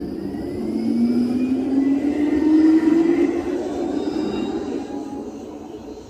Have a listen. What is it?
Soundscapes > Urban
final tram 17
hervanta, tram